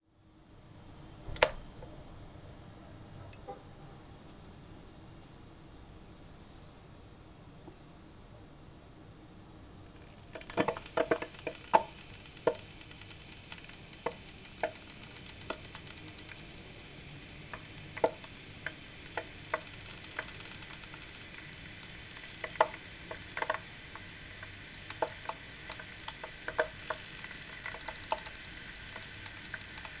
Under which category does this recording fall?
Soundscapes > Indoors